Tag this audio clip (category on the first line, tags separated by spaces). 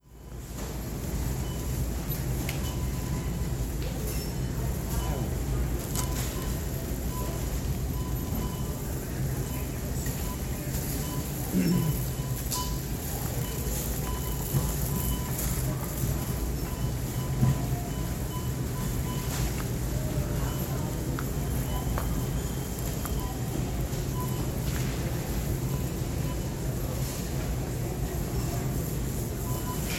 Soundscapes > Indoors
ambience counter groceries grocery-store Phone-recording shopping-cart